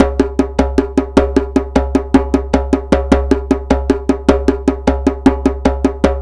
Music > Other
FL studio 9 + kit djembe pattern construction